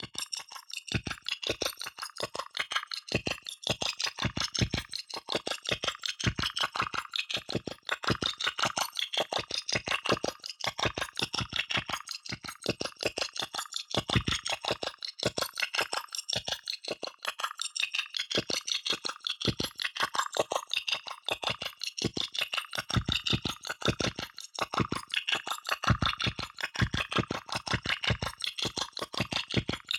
Sound effects > Electronic / Design
Perc Loop-Interesting Granular Percussions Loop 4
All samples used from phaseplant factory. Processed with Khs Filter Table, Khs convolver, ZL EQ, Fruity Limiter. (Celebrate with me! I bought Khs Filter Table and Khs convolver finally!)
Percussion, Loop, Abstract